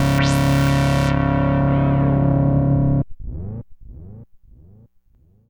Sound effects > Experimental
Analog Bass, Sweeps, and FX-110
alien, analog, analogue, bass, basses, bassy, complex, dark, effect, electro, electronic, fx, korg, machine, mechanical, oneshot, pad, retro, robot, robotic, sample, sci-fi, scifi, sfx, snythesizer, sweep, synth, trippy, vintage, weird